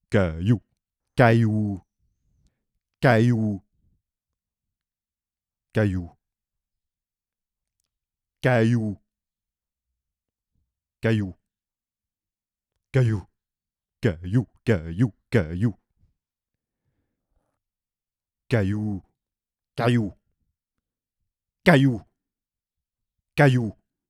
Speech > Solo speech
Cailloux -SM57
Cailloux is the French word for stone. It's a commonish meme about men being satisfied with a stone, kind of worthshipping them as if in the stone-age "Cailloux agougou gaga"... Recorded with a SM57 and A2WS windcover, using a Tascam FR-AV2. Recorded indoors, in my bedroom which has way more reverb than id like... Date : 2025 05 26 I'm a mid 20s male if that's of any relevance.
francais, Kayoux, Sm57